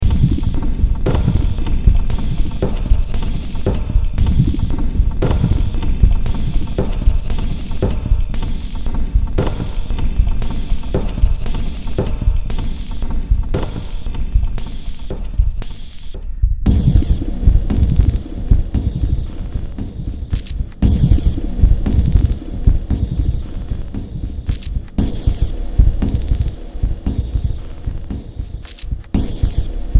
Music > Multiple instruments
Demo Track #3592 (Industraumatic)
Cyberpunk, Soundtrack